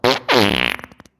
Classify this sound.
Sound effects > Other